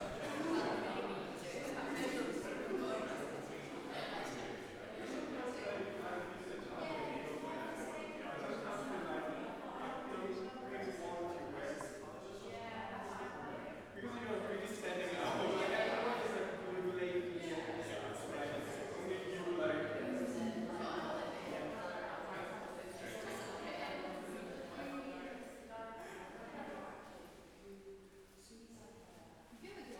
Soundscapes > Indoors
LNDN SOUNDS 013
Some snippets of talking recorded in an art gallery in central London. lots of overlapping echoing voices, mostly indecernable. Recorded with a Zoom H6